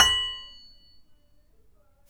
Sound effects > Other mechanisms, engines, machines
metal shop foley -079
bang, boom, bop, crackle, knock, little, metal, oneshot, perc, pop, rustle, sfx, shop, thud, tools